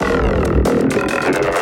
Sound effects > Experimental
destroyed glitchy impact fx -010
pop, otherworldy, lazer, crack, abstract, snap, impacts, idm, percussion, clap, experimental, whizz, glitch, impact, glitchy, edm, fx, hiphop